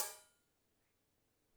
Music > Solo percussion
Recording ook a crash cymbal with all variations
drums, crash, cymbals